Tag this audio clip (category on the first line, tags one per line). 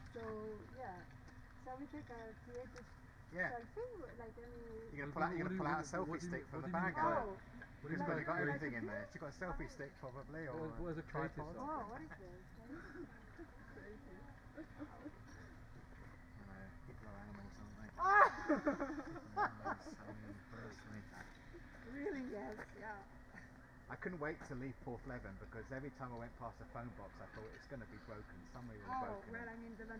Soundscapes > Nature
data-to-sound
natural-soundscape
phenological-recording